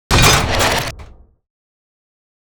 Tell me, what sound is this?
Sound effects > Other
Sound Design Elements Impact SFX PS 085
audio, blunt, cinematic, collision, crash, design, effects, explosion, force, game, hard, heavy, hit, impact, percussive, power, rumble, sfx, sharp, shockwave, smash, sound, strike, thudbang, transient